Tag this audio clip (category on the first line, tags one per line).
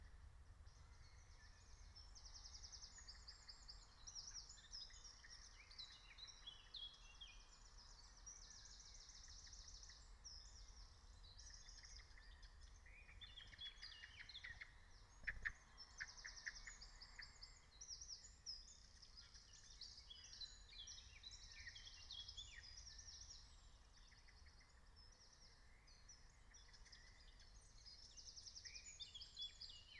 Soundscapes > Nature
phenological-recording
meadow
nature
raspberry-pi
soundscape
field-recording
alice-holt-forest
natural-soundscape